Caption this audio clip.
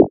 Instrument samples > Synths / Electronic
BWOW 8 Gb
additive-synthesis, bass, fm-synthesis